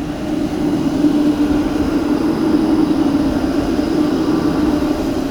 Sound effects > Vehicles
A tram passing by in Tampere, Finland. Recorded with OnePlus Nord 4.